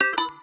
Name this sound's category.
Sound effects > Electronic / Design